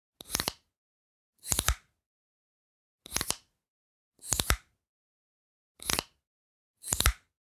Sound effects > Objects / House appliances
Extending and retracting a tripod. Recorded with a Zoom H2n, using only the mid microphone (single cardioid condenser)